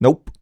Speech > Solo speech
Displeasure - Nope
singletake, FR-AV2, Neumann, Word, Vocal, voice, Video-game, dialogue, Voice-acting, Single-take, displeasure, Tascam, oneshot, U67, Mid-20s, Man, NPC, talk, Male, Human